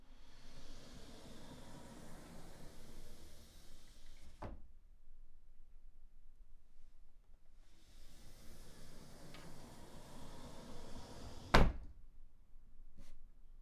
Sound effects > Objects / House appliances
Wardrobe door opening and closing 1
This is a (wooden) wardrobe sliding door being opened and closed.
wardrobe, indoors, closing, sliding, home